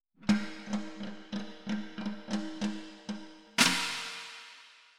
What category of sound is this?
Music > Solo percussion